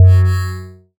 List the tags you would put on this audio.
Instrument samples > Synths / Electronic
bass
fm-synthesis
additive-synthesis

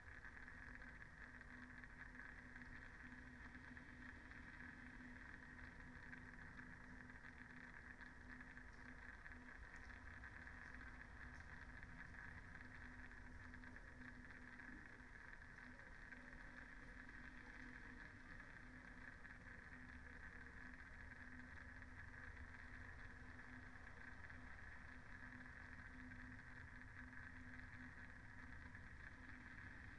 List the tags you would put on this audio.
Soundscapes > Nature
data-to-sound
natural-soundscape
phenological-recording
raspberry-pi
weather-data

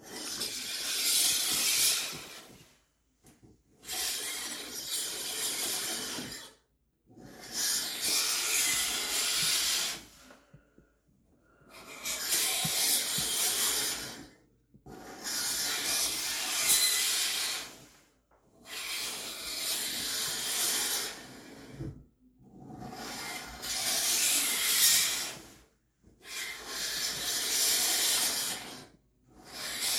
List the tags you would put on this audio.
Sound effects > Objects / House appliances
back
curtain
foley
forth
old-fashioned
Phone-recording
shower
slide

